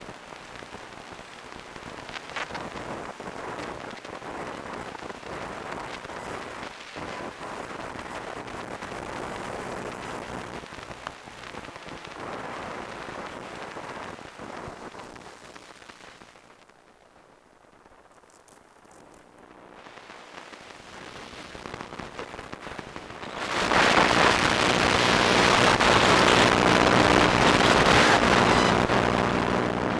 Sound effects > Objects / House appliances
Electromagnetic field recording of Elektron Digitakt 2 by using a pickup coil and Zoom h1n. Electromagnetic Field Capture: Electrovision Telephone Pickup Coil AR71814 Audio Recorder: Zoom H1essential
electromagnetic, coil, laptop, noise, field, electric, electrical, pick-up, elektron, Dikitakt, pickup, field-recording, magnetic